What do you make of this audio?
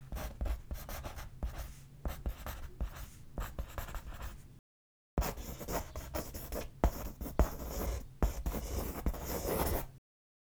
Sound effects > Objects / House appliances
Pencil write words
Pencil scribbles/draws/writes/strokes words.
draw, pencil, write